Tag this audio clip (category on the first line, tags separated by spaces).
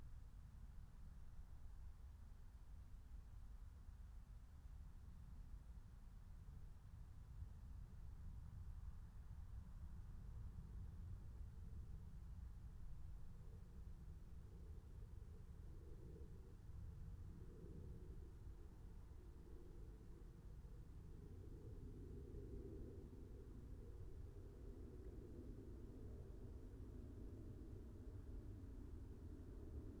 Soundscapes > Nature
field-recording raspberry-pi